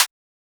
Instrument samples > Percussion
8 bit-Noise Shaker1
percussion, FX, 8-bit